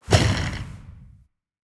Sound effects > Other
Magic sword whoosh
This sound was made, recorded and processed in DAW; - Magic whoosh that sounds like some sword swing or skill use, i don't know. Made by layering highly processed recordings of real objects and fully synthesized whoosh sound you can hear at the background. - The sound effect contains layers made entirely by me and i did NOT sample/took sample(s) from any libraries. - Ы.